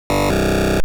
Sound effects > Electronic / Design
retro access denied
made on an emulated gameboy (on furnace tracker)
access-denied, furnacetracker, retro, furnace-tracker, gameboy